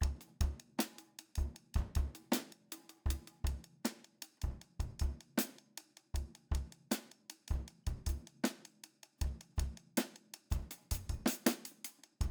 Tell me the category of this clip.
Music > Solo percussion